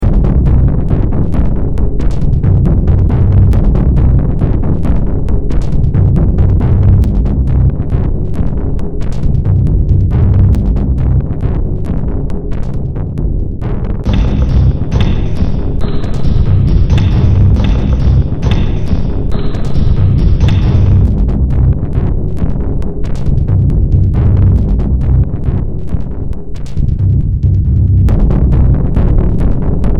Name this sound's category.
Music > Multiple instruments